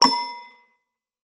Sound effects > Electronic / Design
Reminiscent of "that" game...but meticulously crafted from original sources.
game, interface, ui